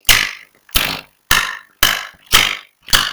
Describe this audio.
Speech > Solo speech
i used my mouth to make this bone breaking for a game of mine
vocal, talk, speak